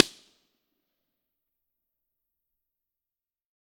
Soundscapes > Other
Subject : Impulse response for convolution reverb. Date YMD : 2025 August 11 Early morning. Location : Albi 81000 Tarn Occitanie France. Mostly no wind (Said 10km/h, but places I have been were shielded) Processing : Trimmed and normalised in Audacity. Very probably trim in, maybe some trim out.